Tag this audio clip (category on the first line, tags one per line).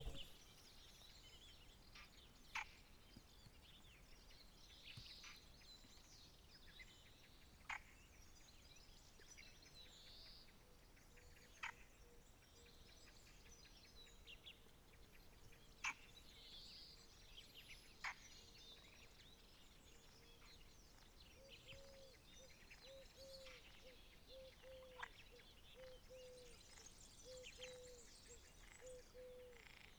Sound effects > Animals
BIRDSONG
FROGS
LAKE
NATURE
WILDLIFE